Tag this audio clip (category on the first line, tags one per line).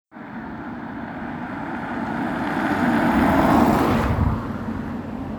Sound effects > Vehicles
asphalt-road
car
moderate-speed
passing-by
studded-tires
wet-road